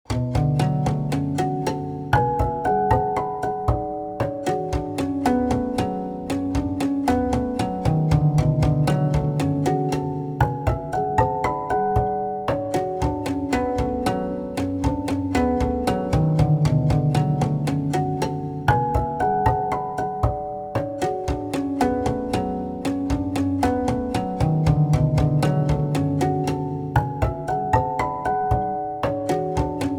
Music > Solo instrument
Pretty Guqin String Loop w Spectral Delay
A pretty but kind of enigmatic Guqin String loop created in FL Studio using Kontakt, Melda, Raum, Fab Filter, and various other VSTs. Reminiscent of Aphex Twin or Boards of Canada, could be used for film or videogame sound design
delicate, string, prepared, sad, cinemtatic, loopable, strange, harmonics, pluck, strings, trippy, melodic, pretty, guqin, dark, ambient, beautiful, chill, percussion, film, plucked, sound-design, videogame, oriental, harmonic, loop, asian